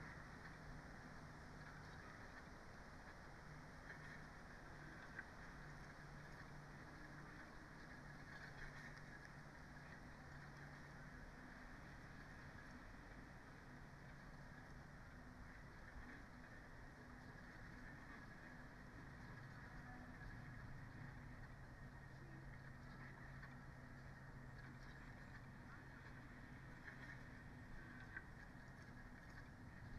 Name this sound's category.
Soundscapes > Nature